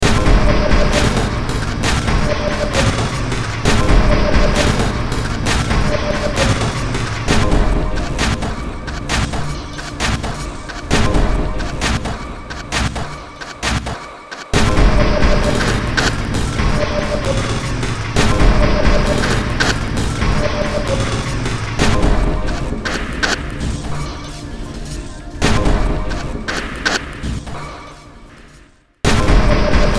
Music > Multiple instruments
Short Track #3382 (Industraumatic)
Cyberpunk, Games, Noise, Industrial, Underground, Soundtrack, Ambient, Sci-fi, Horror